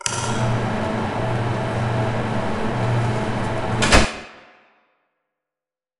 Sound effects > Other mechanisms, engines, machines
Lab Door Open and Close Industrial Sci-Fi Games
A clean, mechanical sliding door sound designed for use in laboratory, sci-fi, or industrial settings. The effect includes both the opening and closing of the door in a single clip, making it useful for security doors, airlocks, containment chambers, or high-tech facilities in games or films.
airlock, automatic-sound, door-close, door-open, industrial, lab-door, metal, sci-fi, sfx, sliding-door, sound-effect, tech